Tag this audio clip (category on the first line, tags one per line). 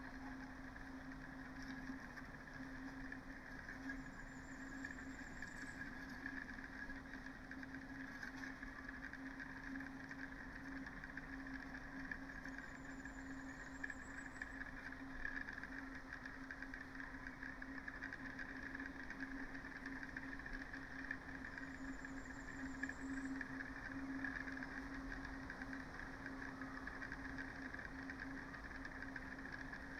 Soundscapes > Nature
raspberry-pi
phenological-recording
data-to-sound
natural-soundscape
Dendrophone
soundscape
nature
artistic-intervention
weather-data
modified-soundscape
sound-installation
alice-holt-forest
field-recording